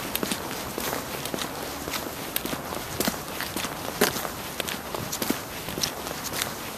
Sound effects > Human sounds and actions
Walking on wet asphalt. This sound was recorded by me using a Zoom H1 portable voice recorder. Tempo 141 bpm.
asphalt, foot, footsteps, steps, Walking, wet